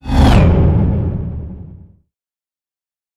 Sound effects > Other
Effects recorded from the field.